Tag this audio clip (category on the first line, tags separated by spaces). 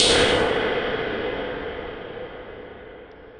Sound effects > Electronic / Design
bash bass brooding cinamatic combination crunch deep explode explosion foreboding fx hit impact looming low mulit ominous oneshot perc percussion sfx smash theatrical